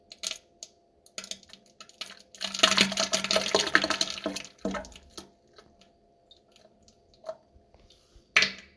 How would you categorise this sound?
Sound effects > Other